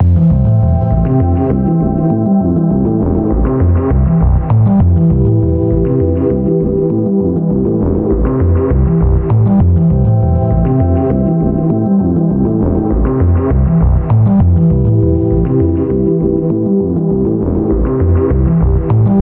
Music > Multiple instruments
Somber Ambient piano loop 100bpm
Made in FL Studio with Flex, yes, I love the Rhodes MK1 piano, it's very versatile. Thanks for the support!